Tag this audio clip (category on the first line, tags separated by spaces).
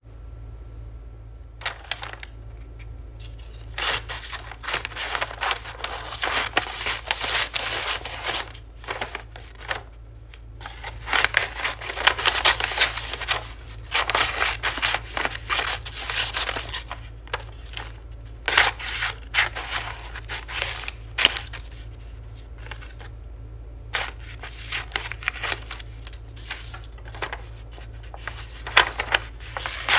Sound effects > Objects / House appliances
cut paper scissor stationary tear